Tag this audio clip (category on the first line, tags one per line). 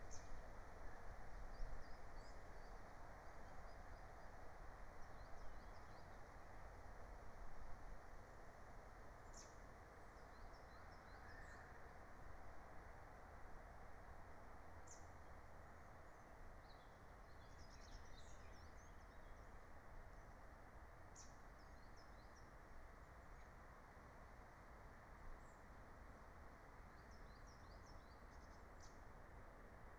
Soundscapes > Nature
raspberry-pi
alice-holt-forest
nature
phenological-recording
natural-soundscape
meadow
field-recording
soundscape